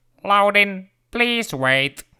Speech > Solo speech
loading please wait robot voice
calm, english, voice, robot